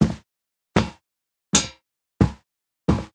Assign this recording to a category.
Sound effects > Human sounds and actions